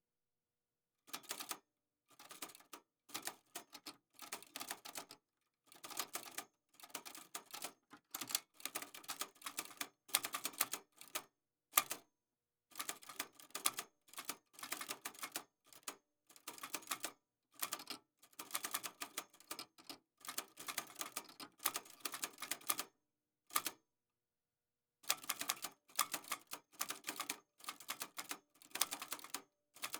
Sound effects > Objects / House appliances
Typewriter Typing 02
A foley recording of a vintage typewriter at medium distance of the microphone typing swiftly.
Una grabación de foley de una máquina de escribir vintage a distancia media del micrófono escribiendo rápidamente.
Bell, Ding, Foley, Keyboard, Keys, Mechanical, Old, Typewriter, Vintage